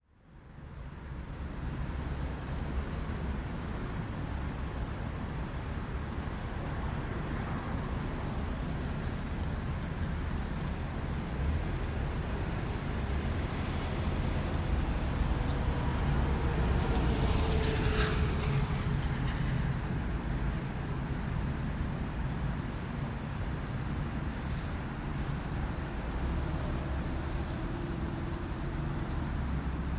Soundscapes > Urban
AMBTraf-Samsung Galaxy Smartphone, CU Traffic, Cars, Trucks, Buses, Motorcycle, Passing, Stop and Go Nicholas Judy TDC
Traffic ambience with cars, trucks, buses and motorcycles passing by, idling. Stop and go.
bus, trucks, Phone-recording, pass-by, buses, ambience, cars, car, truck, traffic, stop-and-go, street, idle, motorcycles, motorcycle